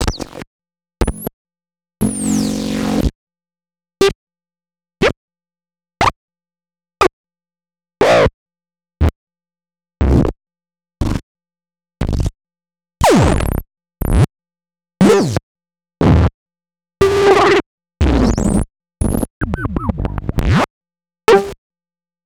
Sound effects > Electronic / Design
NNC sherm-blips 02
Electronic blips n yips from Sherman filterbank
kit, glitch, experimental, drum, electronic, idm